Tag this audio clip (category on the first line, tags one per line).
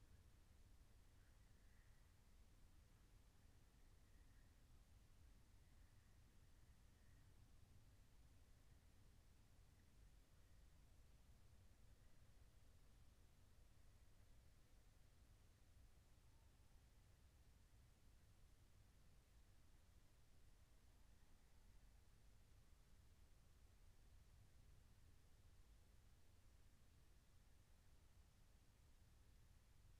Soundscapes > Nature
raspberry-pi
nature
soundscape
phenological-recording
alice-holt-forest
field-recording
meadow
natural-soundscape